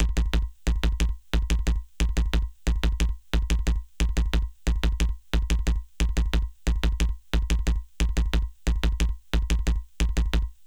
Instrument samples > Percussion
90bpm triplet kick drum thumps, crisp-without-reverb
Rhythmic triplet thuds, heavy kick drum going at 70 bpm. Simple and crisp, minimal reverb. Run through OTT for effect. Tinny top note, pulsing triplet kicks.
90bpm, heavy, hit, kick-drum, loud, low-end, rythmic, steps, thud, triple, triplets